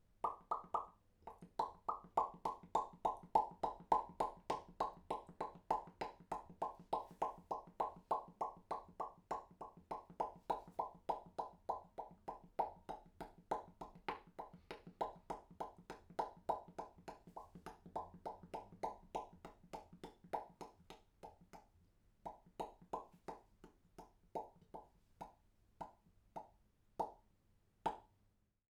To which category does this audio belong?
Sound effects > Human sounds and actions